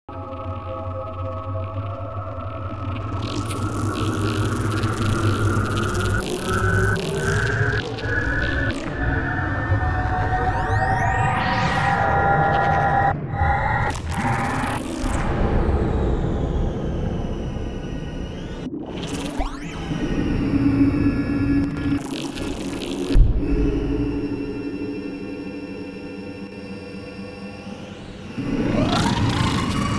Sound effects > Electronic / Design
Warpped Drrone Noiize
An abstract long synthetic sci-fi drone sequence created with various vsts, analog synths and effects. Both Digital and Organic means of processing. Created in FL Studio and processed furhter in Reaper. some VSTs used include Wave Warper 2, Freak, FabFilter, and Valhalla. Enjoy~